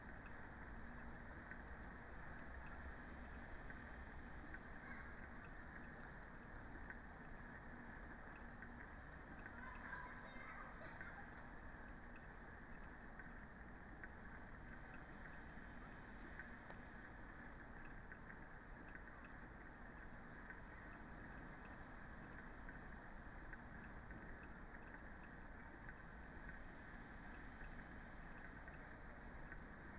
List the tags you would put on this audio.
Soundscapes > Nature
raspberry-pi
Dendrophone
natural-soundscape
modified-soundscape
data-to-sound
soundscape
alice-holt-forest
sound-installation
artistic-intervention
weather-data
field-recording
nature
phenological-recording

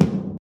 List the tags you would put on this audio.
Instrument samples > Percussion
drum
1-shot
tom

